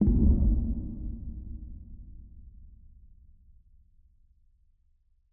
Sound effects > Electronic / Design
SUNKEN BURIED HIT
HIT, LOW, TRAP